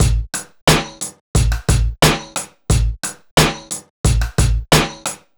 Music > Other
hip hop 4 drums 89 bpm
fl studio pattern construction
hiphop, drums, trash, groovy, loop, beat, quantized, percs, percussion-loop